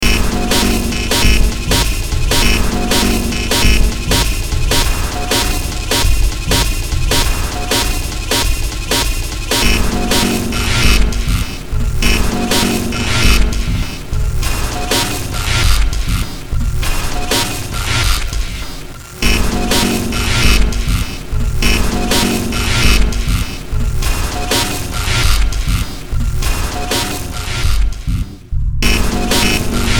Music > Multiple instruments
Short Track #3760 (Industraumatic)
Industrial, Sci-fi, Horror, Games, Noise, Cyberpunk, Underground, Soundtrack, Ambient